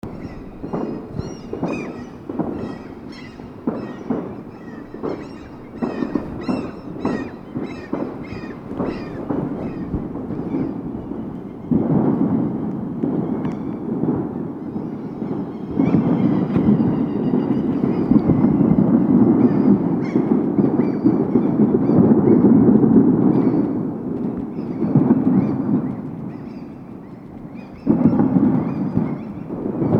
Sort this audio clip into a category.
Soundscapes > Urban